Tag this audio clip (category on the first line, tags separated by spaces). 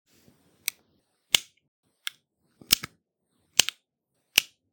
Sound effects > Other mechanisms, engines, machines
Gun Foley Pistol